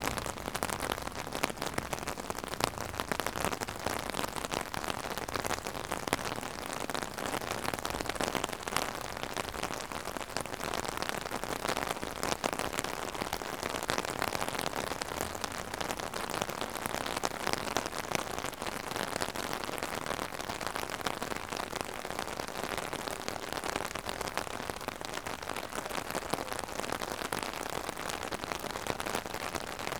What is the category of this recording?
Soundscapes > Nature